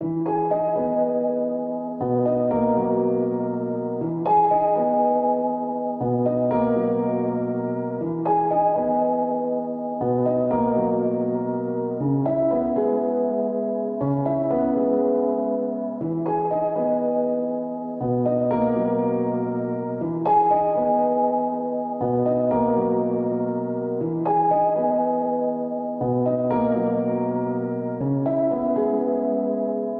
Music > Solo instrument
Piano loops 015 efect 4 octave long loop 120 bpm
reverb, loop, simple, free, 120, 120bpm, pianomusic, samples, piano, simplesamples, music